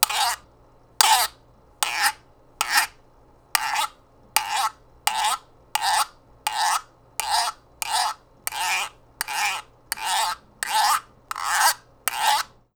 Music > Solo percussion
MUSCPerc-Blue Snowball Microphone, CU Washboard, Grinds, Multiple Nicholas Judy TDC
Multiple washboard grinds.
grind, guiro, Blue-brand, Blue-Snowball, washboard